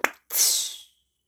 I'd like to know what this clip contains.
Sound effects > Other
TOONPop Whizz, Comical Zing Out Nicholas Judy TDC
A comical pop and whizz off into the distance.